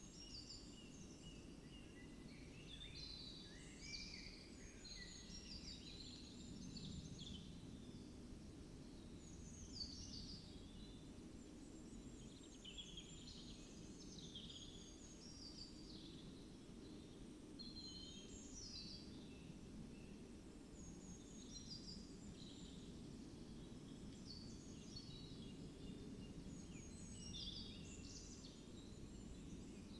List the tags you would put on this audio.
Soundscapes > Nature
natural-soundscape
nature
phenological-recording
weather-data
modified-soundscape
sound-installation
data-to-sound
soundscape
raspberry-pi
field-recording
alice-holt-forest
Dendrophone
artistic-intervention

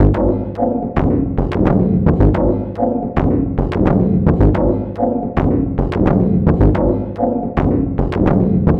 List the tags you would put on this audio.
Instrument samples > Percussion
Soundtrack
Industrial
Loopable
Drum
Weird
Samples
Loop
Dark
Packs
Ambient
Alien
Underground

